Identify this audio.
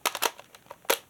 Sound effects > Other mechanisms, engines, machines
Plastic clip going into a BB rifle. Recorded with my phone.
clip-in, latch, reload, gun